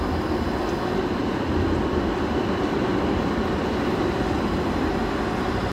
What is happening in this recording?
Soundscapes > Urban
Electric tram operating on metal rails. High-pitched rail friction and metallic wheel screech, combined with steady electric motor hum. Rhythmic clacking over rail joints, bell or warning tone faintly audible. Reflections of sound from surrounding buildings, creating a resonant urban atmosphere. Recorded on a city street with embedded tram tracks. Recorded on iPhone 15 in Tampere. Recorded on iPhone 15 outdoors at a tram stop on a busy urban street. Used for study project purposes.
tram, transportation, vehicle